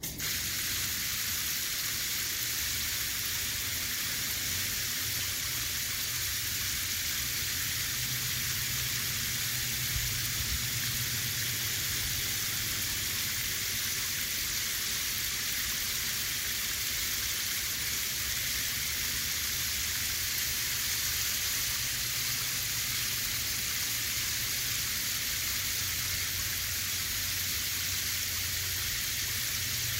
Sound effects > Objects / House appliances
WATRSpray-Samsung Galaxy Smartphone, MCU Hose, On Water Nicholas Judy TDC
A water hose spraying on water.
hose, Phone-recording, spray, water